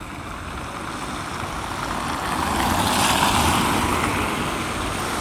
Sound effects > Vehicles
car rain 11
car, engine, rain, vehicle